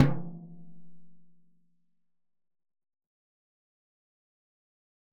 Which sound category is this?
Music > Solo percussion